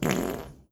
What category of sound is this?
Sound effects > Objects / House appliances